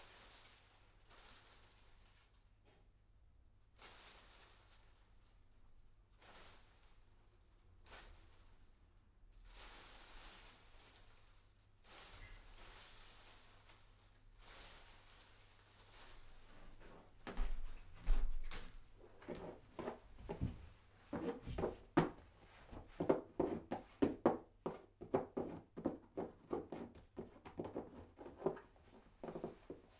Sound effects > Objects / House appliances
People interaction noise - 4
A series of me recording multiple takes in a medium sized bedroom to fake a crowd. Clapping/talking and more atypical applause types and noises, at different positions in the room. Here interacting with different objects and stuff. Recorded with a Rode NT5 XY pair (next to the wall) and a Tascam FR-AV2. Kind of cringe by itself and unprocessed. But with multiple takes mixed it can fake a crowd. You will find most of the takes in the pack.